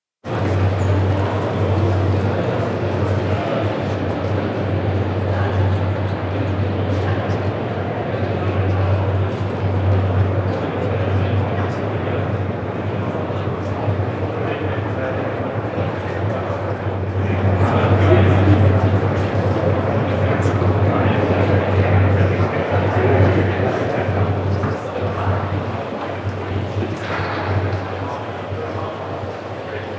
Soundscapes > Indoors
a recording from the top of a large shopping center. with lots of people moving around in the distance and foreground
people, general-noise